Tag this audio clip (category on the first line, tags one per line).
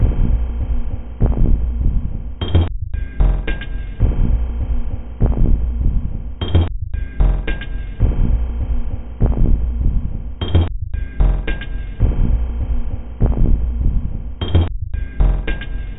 Instrument samples > Percussion
Loop
Packs
Samples
Soundtrack